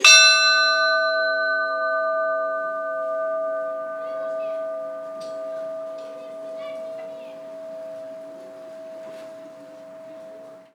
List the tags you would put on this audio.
Instrument samples > Percussion
bells bell church orthodox

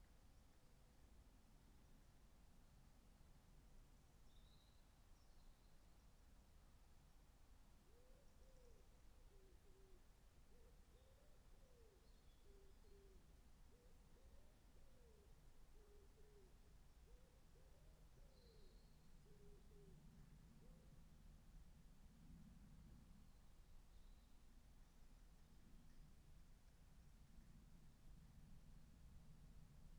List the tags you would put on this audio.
Soundscapes > Nature
phenological-recording raspberry-pi soundscape artistic-intervention Dendrophone weather-data alice-holt-forest natural-soundscape data-to-sound nature modified-soundscape field-recording sound-installation

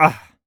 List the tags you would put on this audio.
Speech > Solo speech
2025 Adult arh Calm FR-AV2 Generic-lines hurt Hypercardioid july Male mid-20s MKE-600 MKE600 pain Sennheiser Shotgun-mic Shotgun-microphone Single-mic-mono Tascam VA Voice-acting

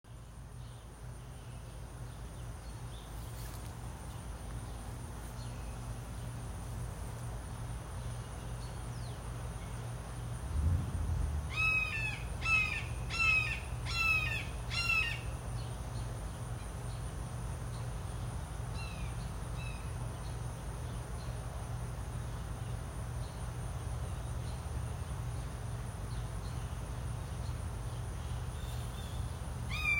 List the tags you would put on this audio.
Sound effects > Animals
birds bird field-recording predator prey aviary scream

